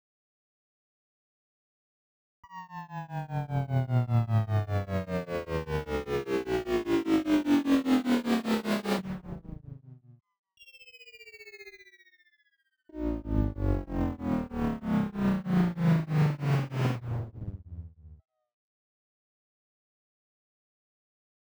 Sound effects > Electronic / Design
Sci-Fi Beam Device - Descending Wobble
A sound I made using loopback feedback from Reason to Reaper. Great for layering in sci-fi device sound design.
synth, beam, charge, sci-fi, device, power, descending, electricity, laser